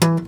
Music > Solo instrument
Acoustic Guitar Oneshot Slice 73
acoustic, chord, foley, fx, guitar, knock, note, notes, oneshot, pluck, plucked, sfx, string, strings, twang